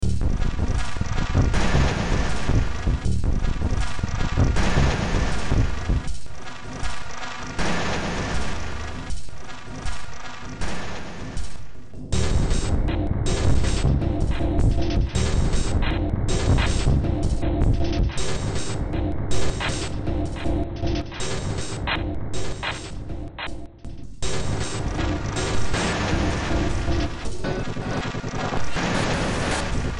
Music > Multiple instruments
Short Track #3939 (Industraumatic)
Noise, Sci-fi